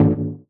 Instrument samples > Percussion
Hi ! That's not recording sound :) I synth it with phasephant!